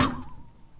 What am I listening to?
Sound effects > Other mechanisms, engines, machines
Handsaw Oneshot Hit Stab Metal Foley 20
foley, fx, handsaw, hit, household, metal, perc, percussion, plank, saw, sfx, shop, tool, twang, twangy, vibe, vibration